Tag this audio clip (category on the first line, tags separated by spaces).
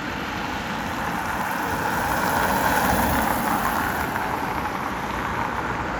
Soundscapes > Urban
Car Drive-by field-recording